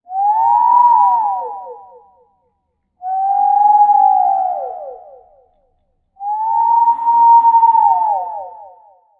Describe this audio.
Sound effects > Human sounds and actions
spooky eerie whistling 03
Ooh, spooky whistling! You must heed to the call of the WHISTLE. Follow its voice. I just made this sound with my voice and edited it using the slowdown and echo effect in TURBOWARP. This is the 3rd one. At least it's better than... 4th?
ambience, creepy, eerie, ghost, ghostly, haunted, haunting, horror, offputting, scary, spooky, unsettling, whistle, whistling